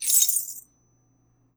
Sound effects > Objects / House appliances
Treasure Collected Coin Tinkle Game Sound Effect
This is an SFX I sampled myself w keychains and coins on an MXL 990 and Mbox interface combo. Plz share what you use it for in comments!
change, coin, collection, currency, dime, game, money, penny, ping, quarter, reward, sfx, time, treasure, videogame